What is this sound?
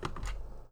Sound effects > Objects / House appliances
COMTelph-Blue Snowball Microphone Nick Talk Blaster-Telephone, Receiver, Pick Up 01 Nicholas Judy TDC
A telephone receiver being picked up.